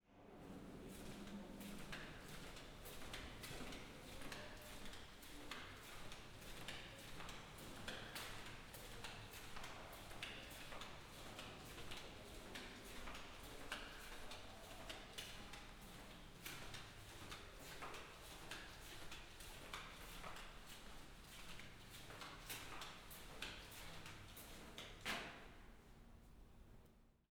Sound effects > Human sounds and actions
Male footsteps walking in flip-flops on a tiled floor, then coming to a halt. Echoey ambience. The Zoom H2essential recorder was used to record this sound.